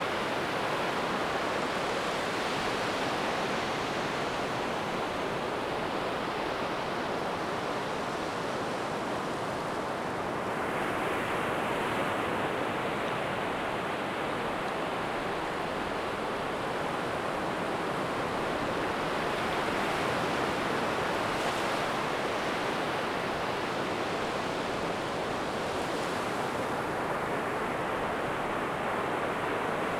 Soundscapes > Nature
Calmer North Sea waves rolling onto the shore, steady and relaxing soundscape.